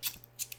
Sound effects > Other
LIGHTER FLICK 11
zippo, flick, lighter